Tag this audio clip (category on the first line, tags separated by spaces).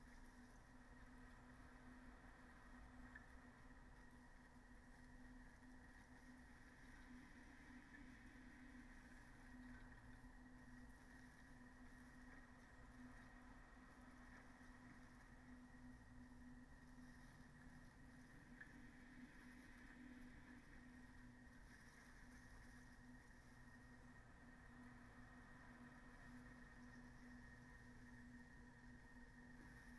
Soundscapes > Nature
phenological-recording soundscape data-to-sound modified-soundscape field-recording raspberry-pi weather-data natural-soundscape sound-installation nature artistic-intervention Dendrophone alice-holt-forest